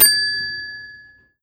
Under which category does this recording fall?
Sound effects > Objects / House appliances